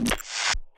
Sound effects > Experimental
Glitch Percs 11 freshzap
abstract, alien, clap, crack, edm, experimental, fx, glitch, glitchy, hiphop, idm, impact, impacts, laser, lazer, otherworldy, perc, percussion, pop, sfx, snap, whizz, zap